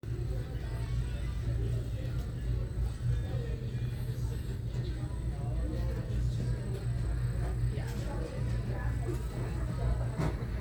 Soundscapes > Indoors
Inside a book store collecting ambient sounds such as voices and movement
Store, Ambience, Room